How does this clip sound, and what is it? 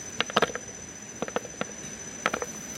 Soundscapes > Other
pour Coffee seed into plastic jar in a forest
I needed that sound effect and can not find it. So I made it. In this i was pouring a coffee seed from my hand in to empty plastic jar and there you have it.
Field, Seeds, unedited